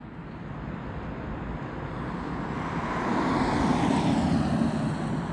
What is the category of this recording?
Soundscapes > Urban